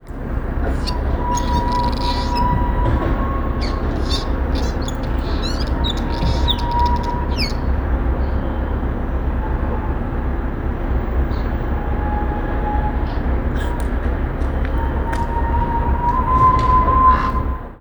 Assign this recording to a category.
Soundscapes > Nature